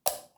Sound effects > Objects / House appliances
Simple sound effect of me pressing my bedroom light switch, it has a little echo in the background but can be removed by using an audacity plugins.